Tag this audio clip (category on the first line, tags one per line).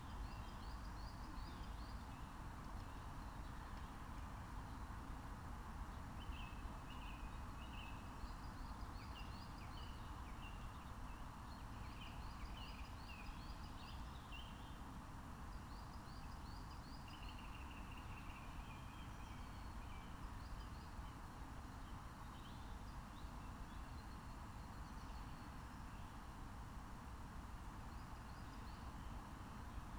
Soundscapes > Nature
alice-holt-forest
nature